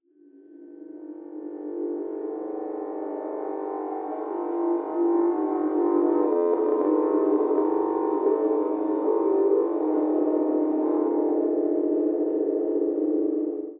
Sound effects > Experimental
a weird drone i made with an the Korg Monologue processed through a few vsts plugs in Reaper